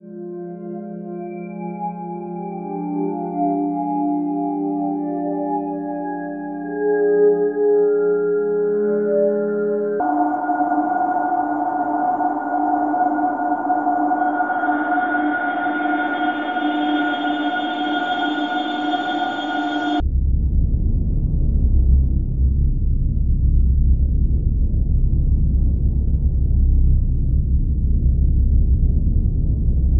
Instrument samples > Synths / Electronic
Morphagene Ambient - MG Space 01
Ambient sounds for Morphagene. Pads and space.